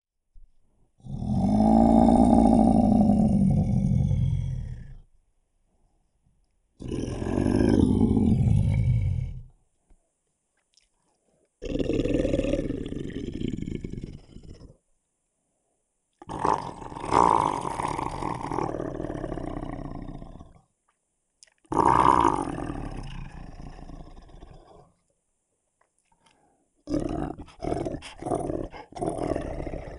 Sound effects > Experimental
Monster, Creature, Zombie, Lion, Tiger
Me using nothing but my voice and a pitch shifter to tune the whole thing down an octave. have Fun! Recorded straight into my laptop via Adobe Audition.
Mystery, Attack, Fantasy, Fear, Horror, Walking, Sound, Scary, Growl, Monster, Roar, Dead, Growling, Creature, Zombie